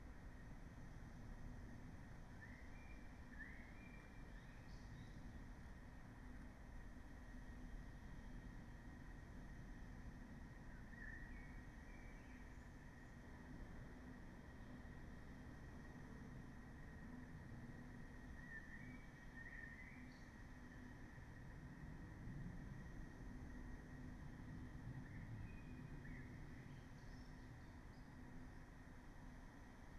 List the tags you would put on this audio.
Soundscapes > Nature
field-recording weather-data sound-installation data-to-sound natural-soundscape alice-holt-forest raspberry-pi Dendrophone nature modified-soundscape soundscape artistic-intervention phenological-recording

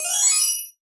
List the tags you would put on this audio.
Sound effects > Electronic / Design
designed pick-up

